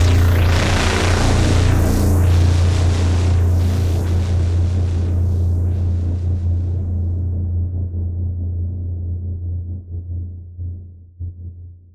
Instrument samples > Synths / Electronic
CVLT BASS 64
bass, bassdrop, clear, drops, lfo, low, lowend, stabs, sub, subbass, subs, subwoofer, synth, synthbass, wavetable, wobble